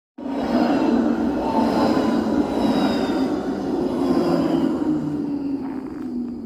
Soundscapes > Urban
final tram 2
tram, hervanta, finland